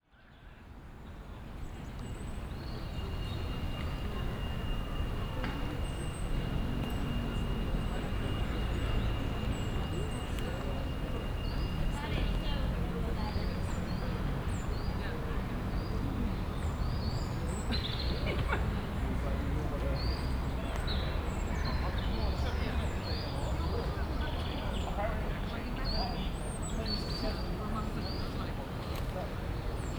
Soundscapes > Nature
Cardiff - Otter Statue, Bute Park
fieldrecording, urban